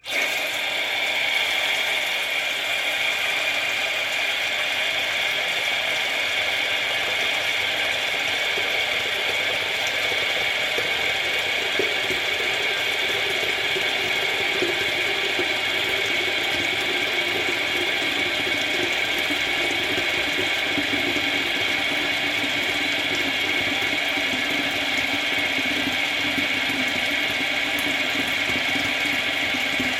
Sound effects > Objects / House appliances
WATRPlmb-Samsung Galaxy Smartphone Sink, Faucet, On, Run, Fill Up, Off Nicholas Judy TDC
A sink faucet turning on, running, filling sink up and turning off. Airy hiss left and water run right.
faucet, fill, Phone-recording, run, sink, turn-off, turn-on